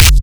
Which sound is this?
Instrument samples > Percussion
BrazilFunk Kick 16 Processed-2
BrazilFunk, Brazilian, BrazilianFunk, Distorted, Kick